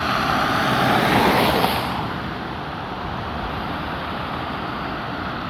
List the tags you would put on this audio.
Soundscapes > Urban
car
engine
vehicle

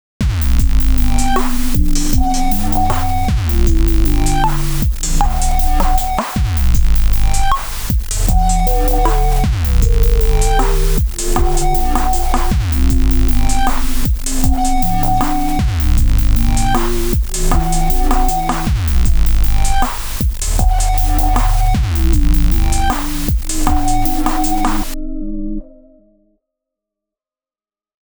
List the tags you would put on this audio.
Music > Multiple instruments
dark
loop
melodic
melody
percussion
beat
downtempo
hop
chill
hiphop
bass
hip

